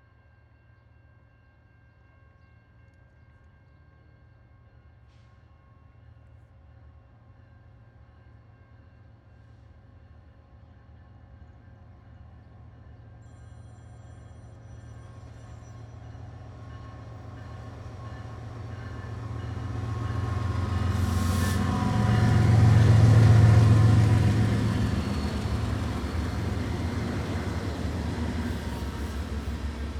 Sound effects > Other mechanisms, engines, machines
BNSF Empty Oil Train 20 mph STEREO
Stereo train recording at the famous Rochelle Railroad Park, Rochelle, IL. This is along empty oil train out of Chicago and heading west. ORTF recording with small diaphragm condensers into Sound Devices Mixpre6.